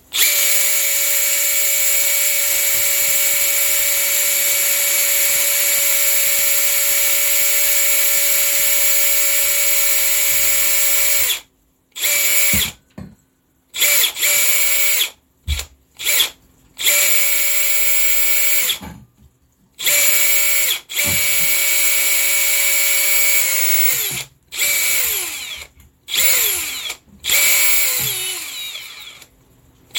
Sound effects > Objects / House appliances
TOOLPowr-Samsung Galaxy Smartphone, CU Hammer Drill, Start, Run, Stop, Various Nicholas Judy TDC
A hammer drill starting, running and stopping various times.
hammer-drill, Phone-recording, run, speed, start, stop, various